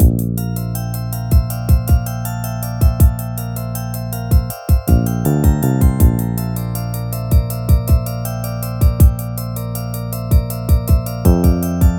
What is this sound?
Music > Multiple instruments
I felt it'd be interesting to add a beat to it to create a rap ballad instrumental. It has a tempo of 80 bpm and can be looped seamlessly on its own or with the other "Always On My Mind" samples.